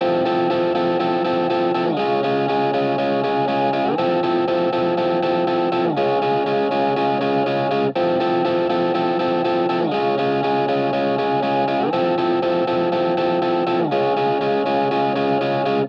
Music > Solo instrument
Guitar loops 124 08 verison 08 120.8 bpm
bpm, electric, electricguitar, loop, reverb, simplesamples